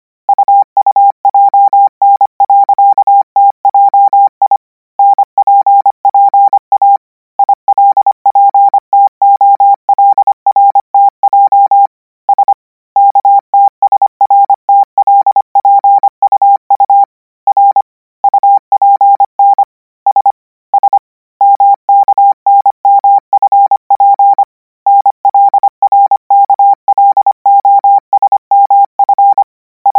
Sound effects > Electronic / Design
Koch 17 KMRSUAPTLOWI.NJEF - 540 N 25WPM 800Hz 90
Practice hear characters 'KMRSUAPTLOWI.NJEF' use Koch method (after can hear charaters correct 90%, add 1 new character), 540 word random length, 25 word/minute, 800 Hz, 90% volume. Code: uujn.tji nppa ilptolrtj s ktsrtlpuu r upn s s mknmfp nlrklosmf f. ojpu sjpnl. lfpepj afsufj tluraut owjpkwa.e surooaaff pjjna empj ptostrl l.jspjsir rroffn. .ntoout le.tkiije rmr..uj. msrj. kewkniewp jommwi rufmltumk sj f m ea.aem umkai .utiapwk wlm rmaii.k kp l l trrr ouifj.j sfen itrpef aanpnnnt p fif li taramrtk jerril m .aimstkjt nowspsf jteo.j.jl ukpfjm kfoajtmt nnssi mmm eu je p ainjrnpt nflus.ft tflluj jrmifpfwr . iwkemliuu rrlrmkje ila lr mulaiw ik wi.j.jt t iuj pprsa epf rw o.llj iiakw emisf kn imaoeutop mo kpf.o.. iwinum naatjm njeasuj mj lo s.ia aro jasium .a mmsaetp fjnkllrn tppnur ppmmoj weje ..frk.
characters, code